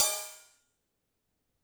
Music > Solo percussion
Recording a crash cymbal with all variations
cymbals, crash, drums